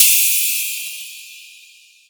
Instrument samples > Percussion
Magical Cymbal2
Cymbal, Enthnic, FX, Magical, Percussion, Synthtic